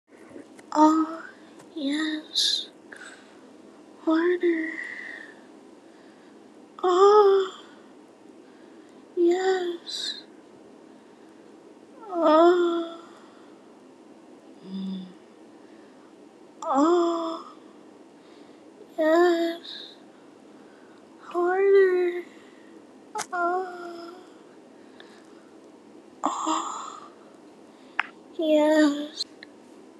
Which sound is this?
Speech > Processed / Synthetic
Orgasm with Lixie!
Having sex with Lexie is so much fun!